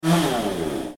Sound effects > Other mechanisms, engines, machines
Chainsaw - Motosierra
Basic sound of a chainsaw for small projects. You can use it, but it would be great if you put my name on it :) Enjoy
motor, chainsaw, start, machine, engine